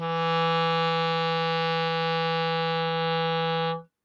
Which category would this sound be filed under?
Instrument samples > Wind